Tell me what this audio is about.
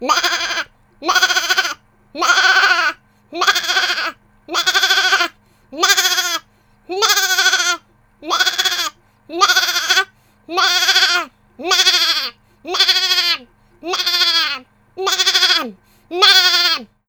Sound effects > Animals
A baby goat kid bleating. Human imitation.